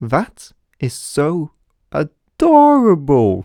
Speech > Solo speech
Affectionate Reactions - That is so adorable
affectionate, dialogue, FR-AV2, Human, Male, Man, Mid-20s, Neumann, NPC, oneshot, reaction, singletake, Single-take, stentence, talk, Tascam, U67, Video-game, Vocal, voice, Voice-acting